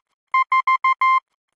Sound effects > Electronic / Design
A series of beeps that denote the number 4 in Morse code. Created using computerized beeps, a short and long one, in Adobe Audition for the purposes of free use.
Telegragh, Morse, Language